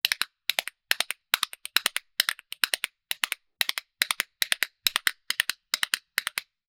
Instrument samples > Percussion
MusicalSpoon Medium Gallop
Recorded On Zoom H5 XY5, AT897 Shotgun Mic, and SM57, and then Summed to Mono (all mics aprox < 3 feet away From source) Denoised and Deverbed With Izotope RX 11
Gallop, Hit, Horse, Minimal, Musical, Percussion, Slap, Spoon, Strike, Wood